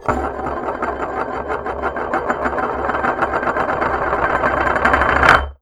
Sound effects > Objects / House appliances
CERMMisc-Blue Snowball Microphone, CU Ceramic Plate, Spinning, Long Nicholas Judy TDC
A long ceramic plate spin.
plate, foley, Blue-Snowball, spin, ceramic, long, cartoon, Blue-brand